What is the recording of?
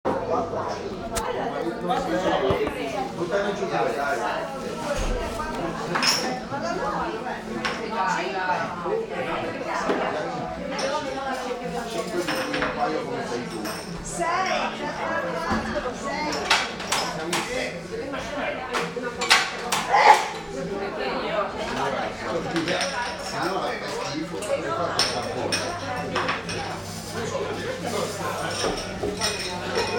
Soundscapes > Urban
Early Morning in a Milan Café